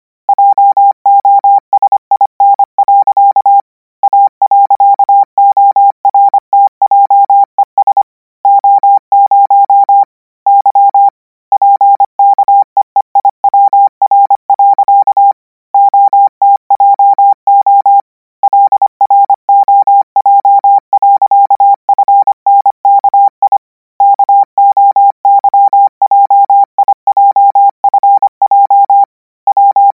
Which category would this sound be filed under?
Sound effects > Electronic / Design